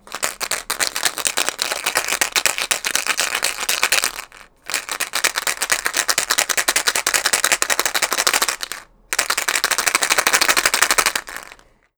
Sound effects > Objects / House appliances
GAMEMisc-Blue Snowball Microphone, CU Dice, Shake, In Cup Nicholas Judy TDC
Dice being shaked in a cup.
cup, foley, shake, Blue-brand, dice, Blue-Snowball